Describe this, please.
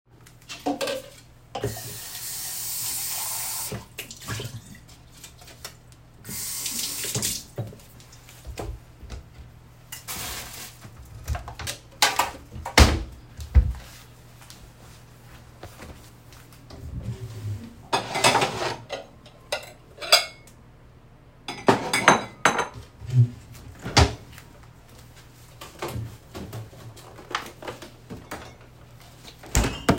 Sound effects > Objects / House appliances
Making lunch
The sound of me making myself a salad for lunch. Includes washing dishes, chopping, etc.
cooking, field-recording, plates, kitchen, dishes